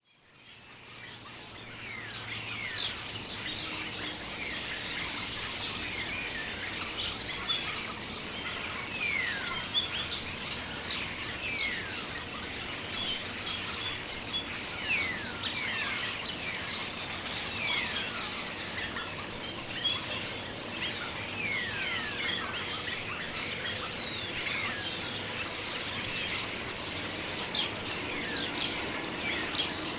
Soundscapes > Other
A back garden recording of a group of common starlings from a tree 50m away.
bird, birdsong, common-starling, field-recording, morning, residential